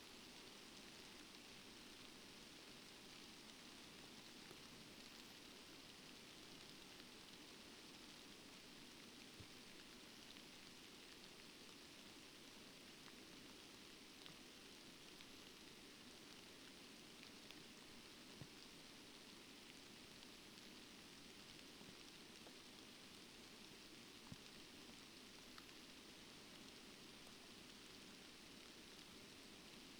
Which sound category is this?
Soundscapes > Nature